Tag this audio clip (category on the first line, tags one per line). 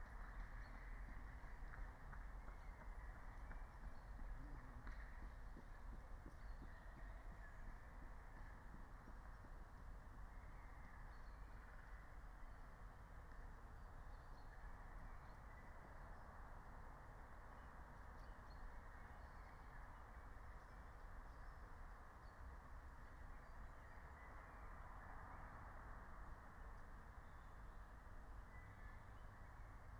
Soundscapes > Nature
soundscape
field-recording
phenological-recording
natural-soundscape
meadow
alice-holt-forest
raspberry-pi
nature